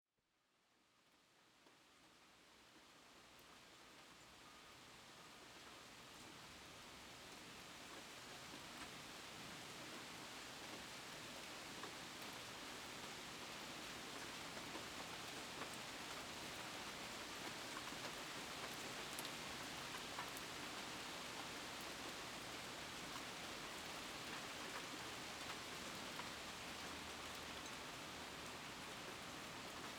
Soundscapes > Nature
33 Minute Thunderstorm
A thunderstorm recorded by my condo's deck with MANY loud thunderclaps. I couldn't get a gradual buildup with the storm approaching due to landscapers mowing the grass. They stuck around till the last minute! They left as soon as the rain started falling so I began recording from there. My wind chimes are also heard throughout the recording. Recorded with my usual Zoom H6 Essential. Edited with AVS Audio editor.